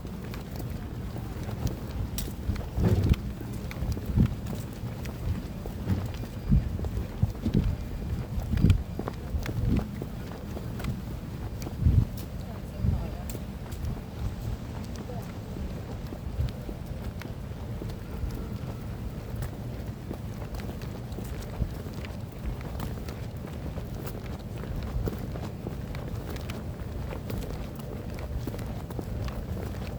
Soundscapes > Urban
walking as a group at campus, recorded with a Samsung Galaxy a55.
footsteps, walking, traffic